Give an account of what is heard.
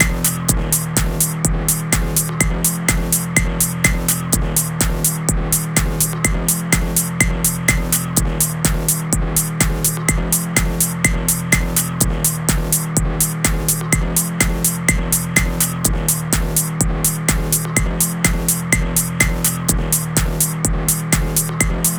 Music > Multiple instruments
basic techno idea with a few samples, distorted and raw
Made in FL10, basic samples into an idea.
distorted, flstudio, raw, techno